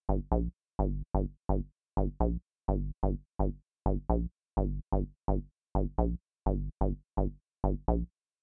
Music > Solo instrument
Acid loop recording from hardware Roland TB-03